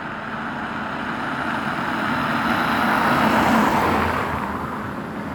Sound effects > Vehicles
Car passing by at moderate speed (30-50 kph zone) with studded tires on a wet asphalt road. Recorded in Tampere, Finland, in December 2025 in a wet weather with mild wind. May contain slight background noises from wind, my clothes and surrounding city. Recorded using a Samsung Galaxy A52s 5G. Recorded for a university course project.
car, studded-tires